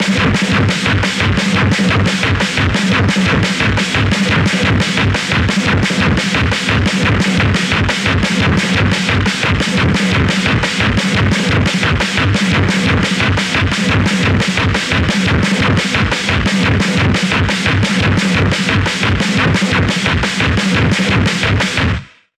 Instrument samples > Percussion
Simple Bass Drum and Snare Pattern with Weirdness Added 003
Experimental-Production, Experiments-on-Drum-Beats, Experiments-on-Drum-Patterns, Fun, FX-Drum, FX-Drums, FX-Laden-Simple-Drum-Pattern, Interesting-Results, Noisy, Silly, Simple-Drum-Pattern, Snare-Drum